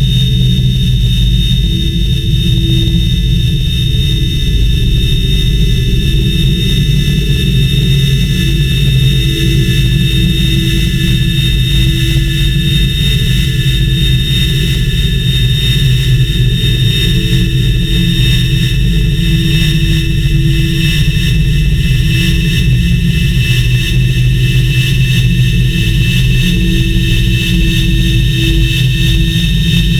Sound effects > Experimental
"Machines worked around the clock to build their new home." For this sound effect I first recorded ambient noises in my home using a Zoom H4n multitrack recorder. I then imported those audio files into Audacity where I layered and shaped them into this final piece.
oscillation, cycles, zoom-h4n, cavernous, wind, metallic, static, howling, audacity